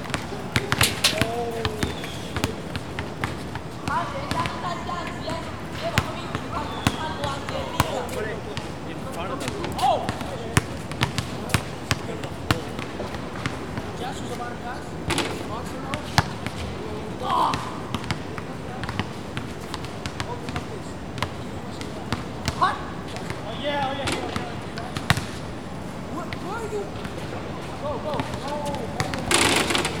Soundscapes > Urban
People playing hoops at the park
Three different groups of people, some closer than others, playing hoops on a basketball court at Seward Park
recording, basketball, field, park, walk, hoops